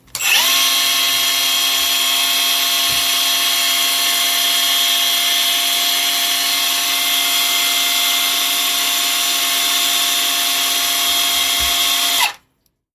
Sound effects > Objects / House appliances
TOOLPowr-Samsung Galaxy Smartphone, CU Circular Saw, Start, Run, Stop Nicholas Judy TDC
A circular saw starting, running and stopping.